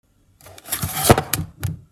Sound effects > Objects / House appliances
I tipped over a small stool and deepened the sound to make it sound heavier like a table. Was recorded with an iPhone SE and edited with Audacity.